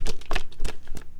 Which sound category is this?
Sound effects > Objects / House appliances